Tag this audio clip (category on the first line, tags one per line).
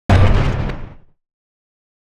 Sound effects > Other
shockwave
crash
effects
collision
heavy
strike
hit
thudbang
design
hard
blunt
sound
smash
power
game
sharp
transient
force
impact
percussive
cinematic
audio
sfx
explosion
rumble